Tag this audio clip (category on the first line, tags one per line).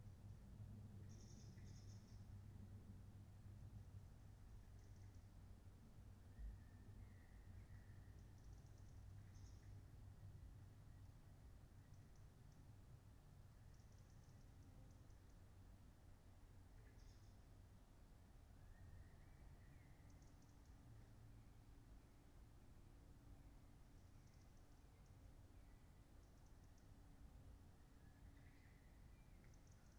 Soundscapes > Nature
Dendrophone
weather-data
raspberry-pi
sound-installation
data-to-sound
artistic-intervention
natural-soundscape
nature
modified-soundscape
field-recording
alice-holt-forest
soundscape
phenological-recording